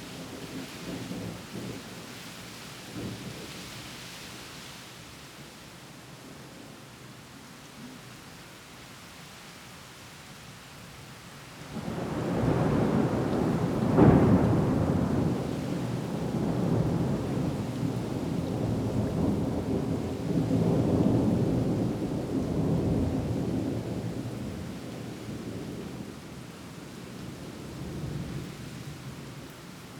Soundscapes > Nature
LLUVIA-TRUENOS FUERTES
Que viva el sonido.
rain, storm, naturalsound, soundfield, thunder